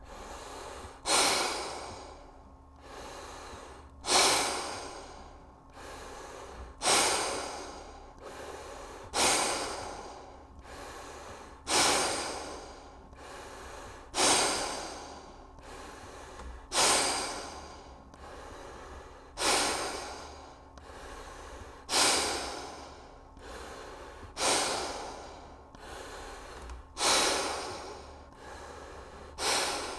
Sound effects > Human sounds and actions
Someone breathing through a respirator.